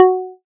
Instrument samples > Synths / Electronic
APLUCK 2 Gb
additive-synthesis, fm-synthesis, pluck